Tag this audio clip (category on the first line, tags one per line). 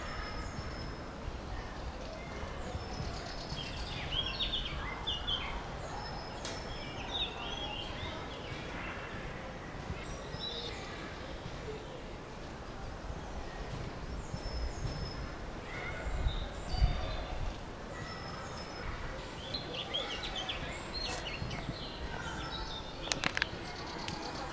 Soundscapes > Nature
Rossinyol
Baloo
Tudo